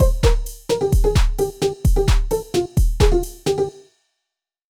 Music > Solo instrument
a short loop
Better when played even faster (made with fl studio)
90bpm, algorithm, intermezzo, sort, synth